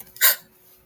Sound effects > Human sounds and actions
little girl's gasp
I used the computer to record, and I did this high pitch impression, I moisturized my throat with water before recording
female, girl, talk, teen